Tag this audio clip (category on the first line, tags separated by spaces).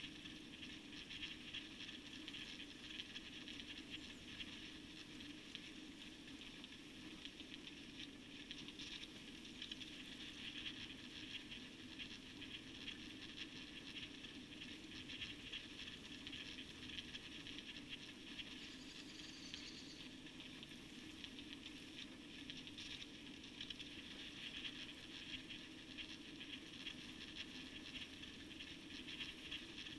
Soundscapes > Nature
natural-soundscape field-recording nature phenological-recording data-to-sound artistic-intervention soundscape alice-holt-forest weather-data